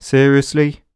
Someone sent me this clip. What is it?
Speech > Solo speech
Annoyed - Seriously
voice, Neumann, Male, NPC, grumpy, upset, singletake, FR-AV2, Man, U67, Video-game, Single-take, dialogue, Vocal, Voice-acting, Mid-20s, oneshot, annoyed, Tascam, talk, Human